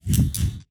Sound effects > Other
combo fire lightning
32 - Combined Fire and Lightning Spells Sounds foleyed with a H6 Zoom Recorder, edited in ProTools together
combination, fire, lightning, spell